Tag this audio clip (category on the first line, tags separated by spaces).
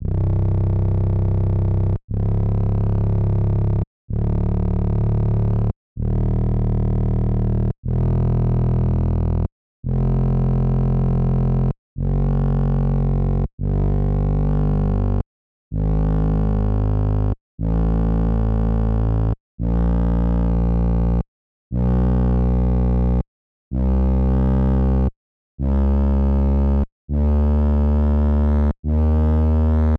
Soundscapes > Synthetic / Artificial
Filter Bass Synth